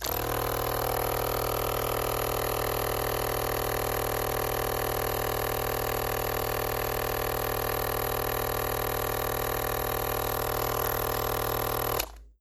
Sound effects > Objects / House appliances
MOTRElec-Samsung Galaxy Smartphone Massager, Turn On, Run, Off Nicholas Judy TDC
A massager turning on, running and turning off.
turn-off, massager, turn-on, Phone-recording, run